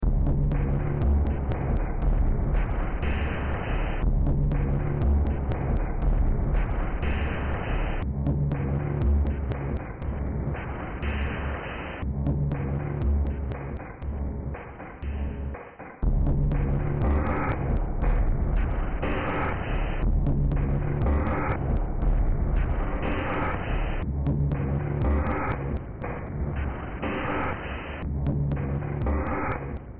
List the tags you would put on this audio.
Music > Multiple instruments
Cyberpunk Games Horror Industrial Soundtrack

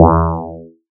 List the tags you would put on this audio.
Instrument samples > Synths / Electronic
bass fm-synthesis additive-synthesis

